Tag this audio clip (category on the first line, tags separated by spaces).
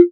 Instrument samples > Synths / Electronic
bass additive-synthesis fm-synthesis